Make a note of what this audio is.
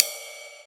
Instrument samples > Percussion
ride bell 2
drums, brass, cymbal, Sabian, metal, jazz, metallic, bell, Paiste, Dream, ride, Zildjian, Wuhan, metronome, ping, drum, rock, alloy, attack, hit, percussion, Royal-Cymbals, strike, flatride, Istanbul, bronze, C-and-C-Cymbals, Meinl